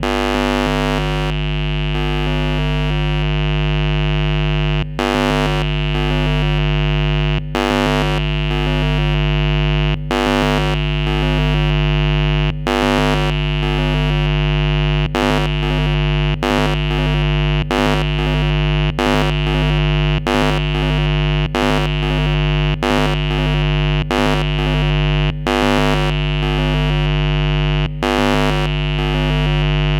Sound effects > Objects / House appliances
LED Transformer Electromagnetic Field Recording
Electromagnetic field recording of an LED transformer Electromagnetic Field Capture: Electrovision Telephone Pickup Coil AR71814 Audio Recorder: Zoom H1essential
coil, electric, electrical, electromagnetic, field, field-recording, LED, magnetic, noise, transformer